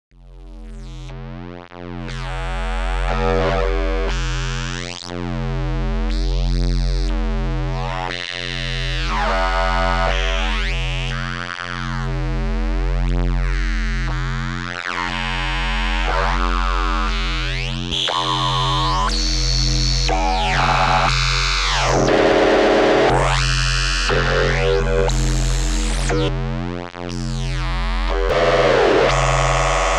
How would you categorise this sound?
Sound effects > Electronic / Design